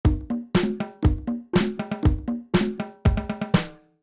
Music > Solo percussion
dance to the music made with bandlabs general midi percussion or general midi drums instrument